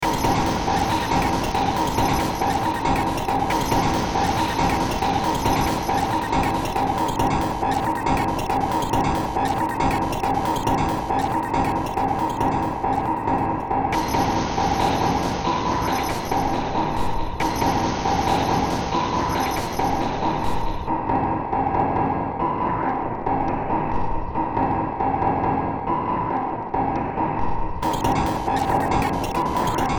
Music > Multiple instruments
Short Track #3368 (Industraumatic)
Horror, Sci-fi